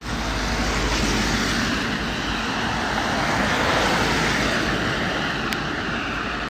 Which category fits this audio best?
Sound effects > Vehicles